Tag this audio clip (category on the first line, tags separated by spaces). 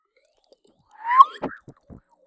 Sound effects > Objects / House appliances
industrial object drill sfx fieldrecording natural foundobject foley hit glass clunk oneshot perc mechanical fx metal bonk stab percussion